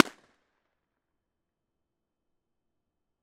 Soundscapes > Other
I&R Albi Madeleine Train station NT5-o
Subject : Impulse response for convolution reverb. Date YMD : 2025 August 11 Early morning. Location : Albi 81000 Tarn Occitanie France. Mostly no wind (Said 10km/h, but places I have been were shielded) Processing : Trimmed and normalised in Audacity. Very probably trim in, maybe some trim out.
Balloon, city, convolution, convolution-reverb, FR-AV2, impulse, Impulse-and-response, impulse-response, IR, NT5-o, NT5o, outdoor, pop, Rode, station, Tascam, train-station